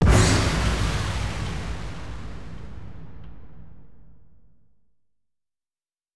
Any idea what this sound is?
Sound effects > Experimental
Jumpscare created for a game using a procesed kickdrum, a very fuzz distorted signal and some wood hits.